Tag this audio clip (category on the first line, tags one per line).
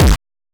Instrument samples > Percussion
BrazilFunk
EDM